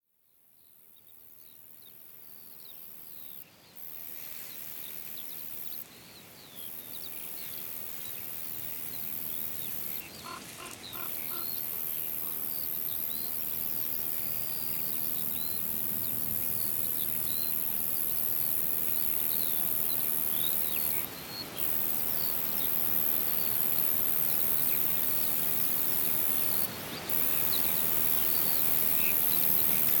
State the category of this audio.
Soundscapes > Nature